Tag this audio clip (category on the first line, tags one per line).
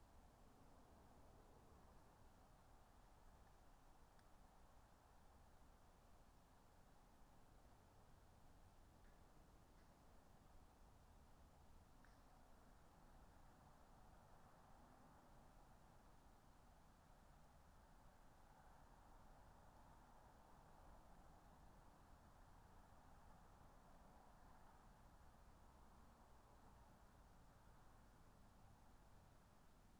Soundscapes > Nature
alice-holt-forest
data-to-sound
nature
phenological-recording
raspberry-pi
sound-installation